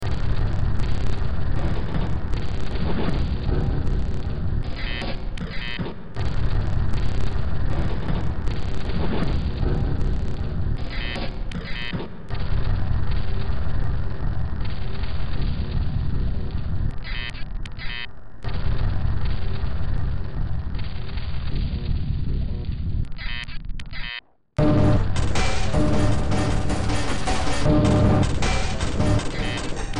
Music > Multiple instruments
Demo Track #3234 (Industraumatic)
Games, Sci-fi, Underground, Noise, Soundtrack, Ambient, Industrial, Cyberpunk, Horror